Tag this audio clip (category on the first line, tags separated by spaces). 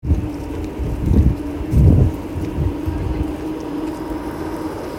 Sound effects > Vehicles
city field-recording Tampere traffic tram